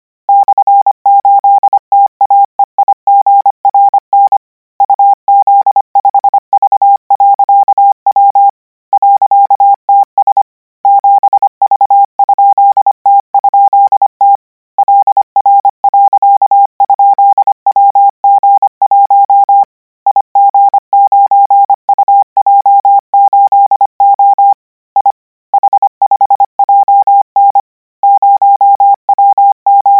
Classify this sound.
Sound effects > Electronic / Design